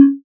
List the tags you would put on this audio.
Instrument samples > Synths / Electronic
fm-synthesis
additive-synthesis

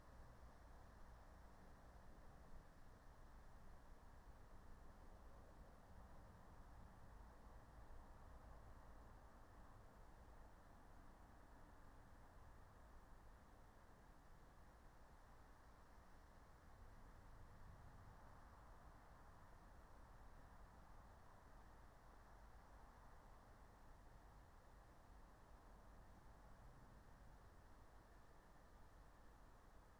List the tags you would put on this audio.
Soundscapes > Nature
soundscape
natural-soundscape
nature
meadow
phenological-recording
field-recording
raspberry-pi
alice-holt-forest

